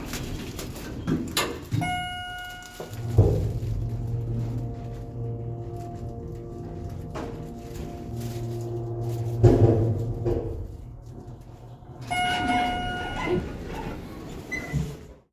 Sound effects > Other mechanisms, engines, machines
MACHElev-Samsung Galaxy Smartphone, CU Elevator, Doors Closing, Operating, Opening Nicholas Judy TDC
Elevator doors closing, operating and opening with beeps. Recorded at Short Pump Town Center.